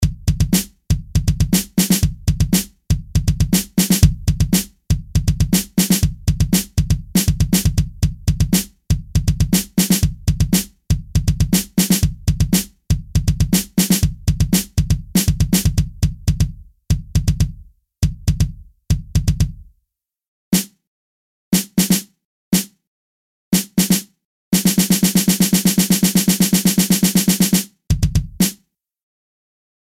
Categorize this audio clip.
Music > Solo percussion